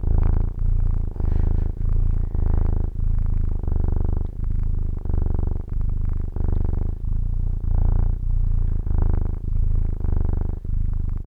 Sound effects > Animals
cat purring, close
cat, purr, purring